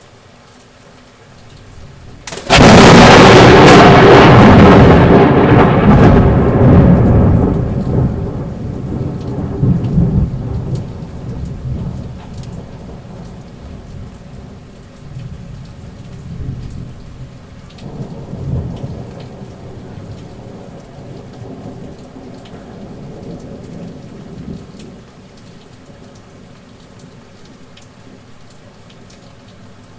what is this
Sound effects > Natural elements and explosions
Tempesta, Tormenta, Storm
Gravació de tempesta a Coín, Màlaga. Gravación de tormenta en Coín, Málaga. Storm recording on Coín, Malaga.
field-recording, nature, rain, rainstorm, strom, thunder, weather